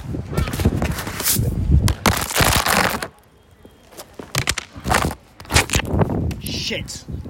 Sound effects > Objects / House appliances
Ipad Drop + curse
5 years ago I worked at a research interview place and dropped an Ipad. I later found out the audio was still recording, so here is the sound of that drop from the Ipad's POV.
broken, shit, swear, drop, ipad, crash, smash, crush